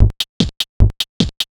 Music > Solo percussion
Short drum loop
Short loop i made in Furnace tracker. 150 bpm, SNES preset.
Furnace-tracker, Drums